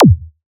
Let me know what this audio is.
Instrument samples > Percussion
Glitch-Lazer Kick 1
Sample used from FLstudio original sample pack. Plugin used: Vocodex.
glitch, kick, organic